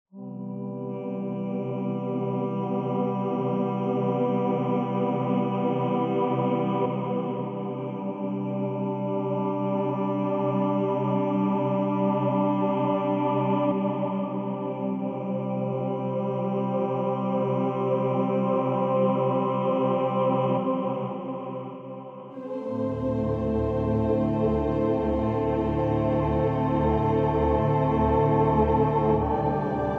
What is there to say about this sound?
Music > Multiple instruments
Verdandi (choral mix)
action, choir, choral, cinematic, drama, epic, instrumental, music, orchestra, orchestral, singing, soundtrack, trailer